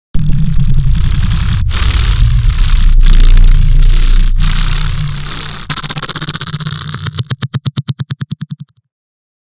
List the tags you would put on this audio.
Sound effects > Electronic / Design
Alien Analog Bass Digital DIY Dub Electro Electronic Experimental FX Glitch Glitchy Handmadeelectronic Infiltrator Instrument Noise noisey Optical Otherworldly Robot Robotic Sci-fi Scifi SFX Spacey Sweep Synth Theremin Theremins Trippy

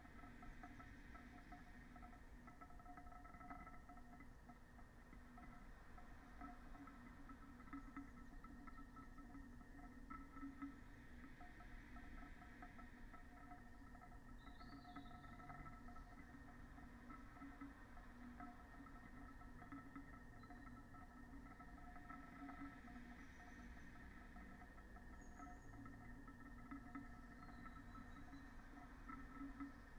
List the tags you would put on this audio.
Soundscapes > Nature
soundscape phenological-recording raspberry-pi field-recording artistic-intervention natural-soundscape nature alice-holt-forest modified-soundscape data-to-sound sound-installation Dendrophone weather-data

